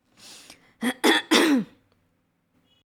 Sound effects > Human sounds and actions
Clearing Throat (Female)
Throat clearing sound effects female, recorded just before she start reading the actual script.